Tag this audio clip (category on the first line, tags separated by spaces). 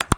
Sound effects > Objects / House appliances
mic3 closing click switch button dji-mic3